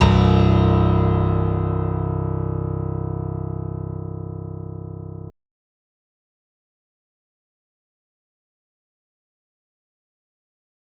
Instrument samples > Piano / Keyboard instruments
A korg M1 style piano.
electronic, korg, piano